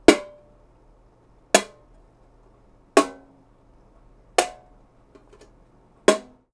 Sound effects > Objects / House appliances
METLImpt-Blue Snowball Microphone, CU Lunchbox Nicholas Judy TDC
Metal lunchbox impacts.
impact, Blue-brand, metal, lunchbox, Blue-Snowball